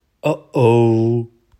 Speech > Solo speech
Uh oh
Standard Uh-Oh sound effect
man, human, voice, uh-oh, male